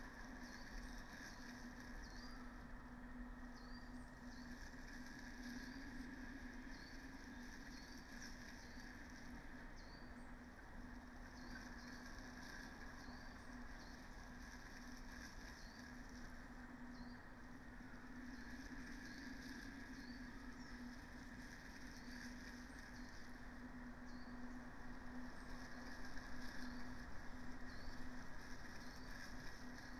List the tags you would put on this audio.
Soundscapes > Nature
weather-data
soundscape
phenological-recording
field-recording
natural-soundscape
raspberry-pi
data-to-sound
modified-soundscape
sound-installation
nature
artistic-intervention
Dendrophone
alice-holt-forest

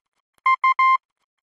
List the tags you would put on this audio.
Sound effects > Electronic / Design
Language
Morse
Telegragh

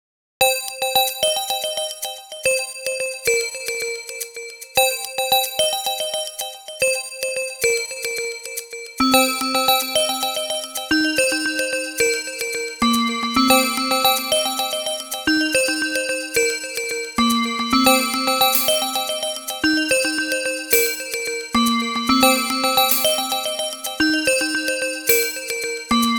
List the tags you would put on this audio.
Music > Multiple instruments
Delay
Echo
Bells
Rhythmic

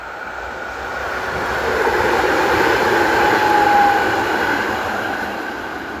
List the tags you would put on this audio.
Soundscapes > Urban
Drive-by
field-recording
Tram

